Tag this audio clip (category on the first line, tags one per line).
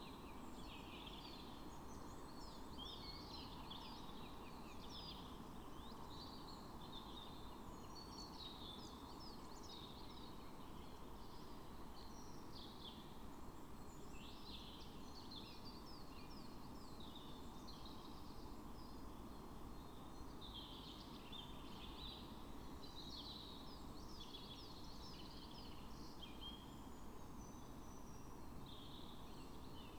Soundscapes > Nature
modified-soundscape
data-to-sound
soundscape
sound-installation
Dendrophone
nature
phenological-recording
natural-soundscape
weather-data
raspberry-pi
alice-holt-forest
artistic-intervention
field-recording